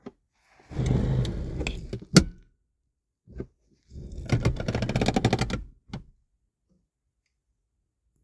Sound effects > Vehicles
Car seat sliding along rail; Slow, dirty sliding of metal, repeated mechanical clicking. Recorded on the Samsung Galaxy Z Flip 3. Minor noise reduction has been applied in Audacity. The car used is a 2006 Mazda 6A.